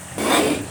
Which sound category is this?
Sound effects > Animals